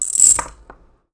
Sound effects > Objects / House appliances
GAMEMisc-Blue Snowball Microphone, CU Dominoes Fall Nicholas Judy TDC
Blue-brand, Blue-Snowball, domino, fall, foley